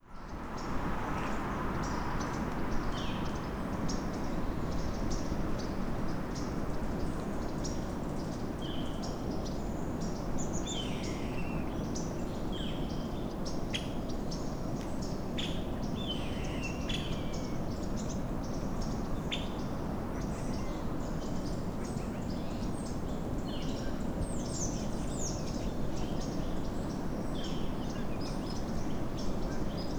Soundscapes > Nature
morning birds-sweet springs-11.29.25
Birds of a wide variety of species greet the day in a grove of Eucalyptus globulus trees along the bay in Los Osos, California.
birds, birdsong, field-recording